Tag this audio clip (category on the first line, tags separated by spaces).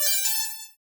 Sound effects > Electronic / Design
coin designed high-pitched tonal pick-up game-audio